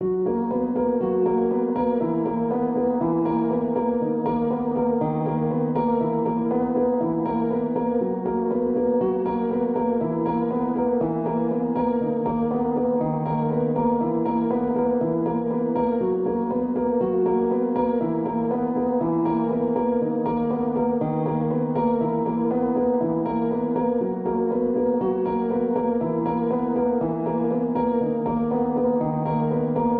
Music > Solo instrument
Piano loops 025 efect 4 octave long loop 120 bpm
reverb, simple, free, 120, 120bpm, loop, samples, piano